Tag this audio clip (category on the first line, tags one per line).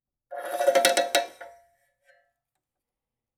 Sound effects > Other mechanisms, engines, machines
Blade
Chopsaw
Circularsaw
Perc
Percussion
Scrape
Shop
Teeth
Tooth
Woodshop